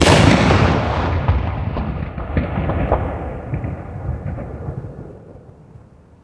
Sound effects > Natural elements and explosions
Illegal Explosion
Loud but pretty and extremely likely forbidden (verboten) piece of fireworks used by a group on Jan 1st 2026 4AM in a Berlin park, surprisingly isolated. There was clipping at the start my phone making it freak out and mute itself for a fraction of a second, at least that's what I think happened. Auto ducking and all. It was quite annoying. Also, the phone was pointing with one mic towards the source (25m?) and the other towards me. This mixed down version is much better on the ears. Recording device: Motorola Moto G34 5G. Mixed down to mono in Audacity, limiter applied.
explosion, bang, world-ender, fireworks, illegal, nye, explosive, tnt, explosives